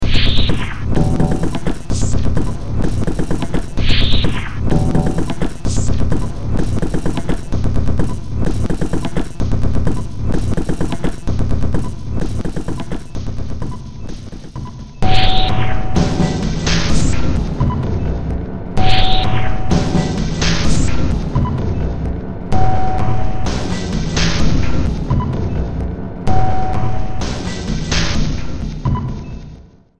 Music > Multiple instruments
Demo Track #3683 (Industraumatic)
Sci-fi, Soundtrack, Underground, Noise, Horror, Industrial, Games, Ambient